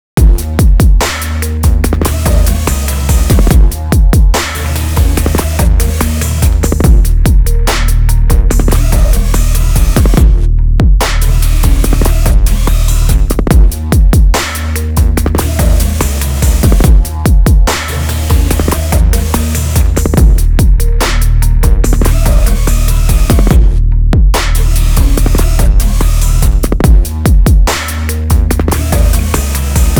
Music > Multiple instruments
hip hop beat loop melody with bass
bass, beat, chill, dark, downtempo, hip, hiphop, hop, loop, melodic, melody, percussion